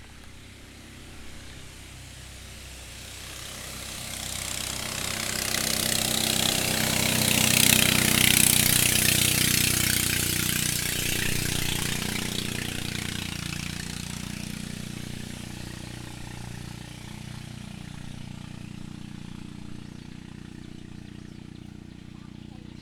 Sound effects > Vehicles
Ghe - Boat
Boat drive by relative's house. Record use Zoom H4n Pro 2025.01.16 10:38
boat, engine, ghe, motor